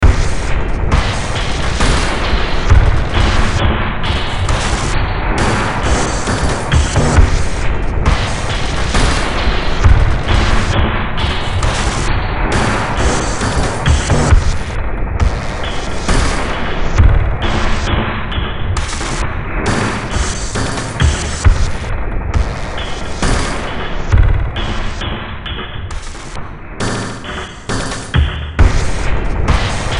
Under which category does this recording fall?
Music > Multiple instruments